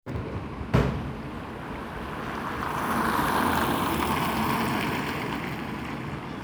Soundscapes > Urban
voice 14-11-2025 10 car
vehicle, Car, CarInTampere